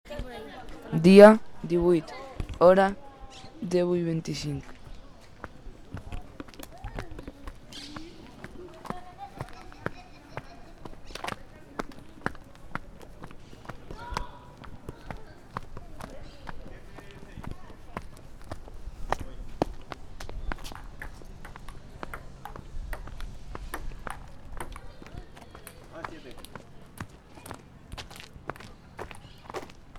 Soundscapes > Urban
20251118 PatiTramuntana AdriaMolina
Urban, Ambience, SoundMap